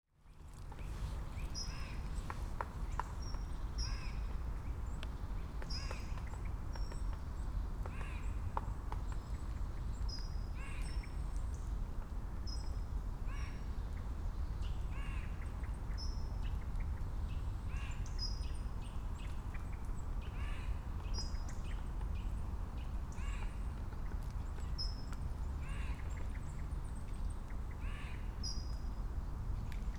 Soundscapes > Other
A morning recording from Hopwas Woods, Staffordshire. Zoom F3. Stereo. EM272Z1 Mics.